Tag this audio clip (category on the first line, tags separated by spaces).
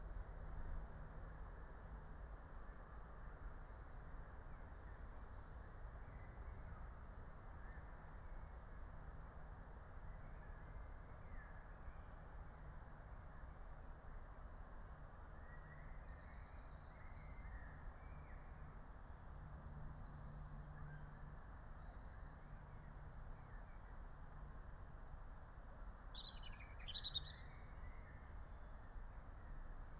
Soundscapes > Nature
raspberry-pi meadow natural-soundscape field-recording nature alice-holt-forest phenological-recording soundscape